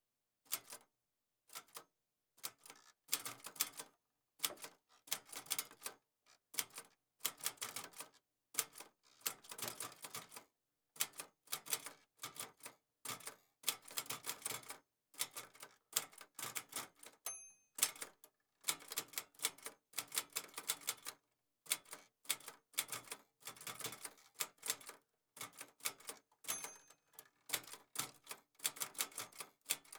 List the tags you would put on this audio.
Sound effects > Objects / House appliances
Ding Retro

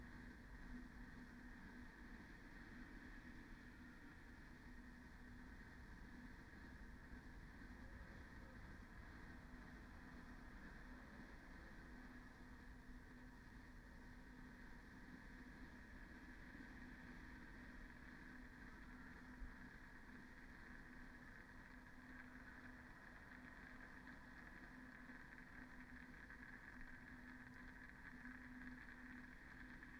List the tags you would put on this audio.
Soundscapes > Nature
phenological-recording artistic-intervention field-recording natural-soundscape alice-holt-forest soundscape data-to-sound Dendrophone nature modified-soundscape sound-installation weather-data raspberry-pi

